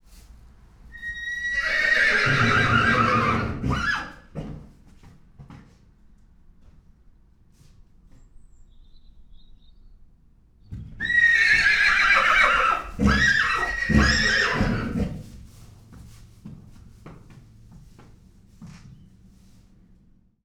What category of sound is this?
Sound effects > Animals